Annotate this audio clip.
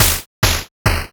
Instrument samples > Percussion
[CAF8bitV2]8-bit Snare1-A Key-Dry&Wet
8-bit, 8bit, Game, Snare